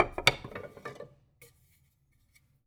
Music > Solo instrument
Marimba Loose Keys Notes Tones and Vibrations 16-001
marimba, block, foley, loose, fx, oneshotes, rustle